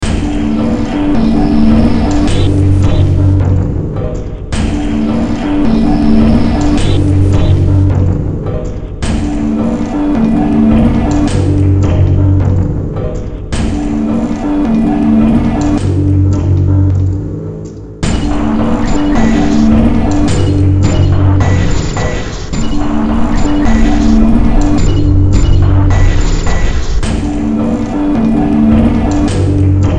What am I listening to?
Music > Multiple instruments
Demo Track #3211 (Industraumatic)
Soundtrack, Cyberpunk, Industrial, Horror, Games, Noise, Underground, Ambient, Sci-fi